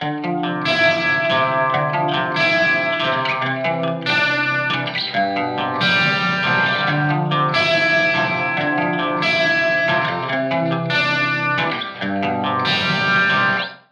Instrument samples > String
guitar melody better luck [next time] 140
lil guitar melody for everyone. not entirely sure what key this is in, though I'm thinking its in D Dorian but not entirely sure on the mode exactly. 2 separate takes panned left and right. tracked with apollo twin thru ableton with various mixing effects like parallel reverb and chorus 😁
melody, 140, 140bpm, electric, guitar, D, emo, dorian